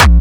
Instrument samples > Percussion
OldFiles-Classic Crispy Kick 1-A#-Processed
Synthed with phaseplant only, just layered 2 noise generator and a 808 kick that synthed with sine wave, then overdrived them all in a same lane. Processed with Khs Distrotion, Khs Filter, Khs Cliper. Final Processed with ZL EQ, OTT, Waveshaper.